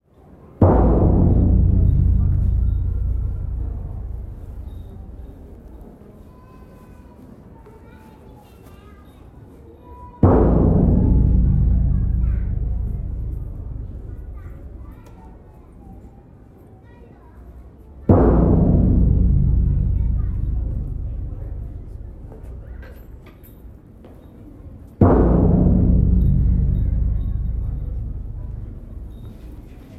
Soundscapes > Urban
Hie Shrine,Shichi-Go-San,Tsuri Taiko (Noise Filtered)
Late November of 2024, the visit of Hie Shrine in Tokyo. It's a great time cuz I saw the shrine hold the Shichi-go-san and a Japanese wedding at the same time. The recording carried a lot children sound, so this is the version of filtering of background, focusing the Taiki.
temple, field-recording, asia, cinematic, tokyo, ambient, background-sound, ambiance, background, oriental, soundscape, japan, drum, traditional, cityscape, shrine, ambience, taiko